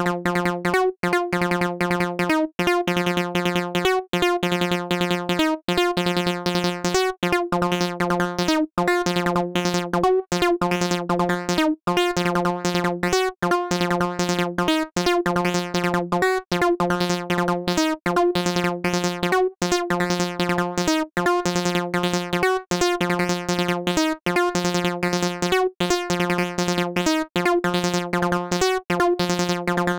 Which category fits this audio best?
Music > Solo instrument